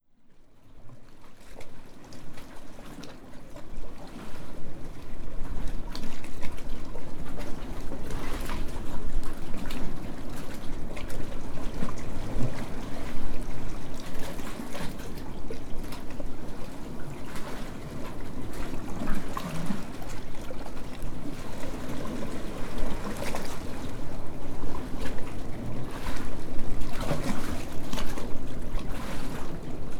Soundscapes > Nature
Resonant sea wash sound in a small cove with a small sea arch. Some of the sounds produced are like soft sighs from some kind of creature. TASCAM DR05X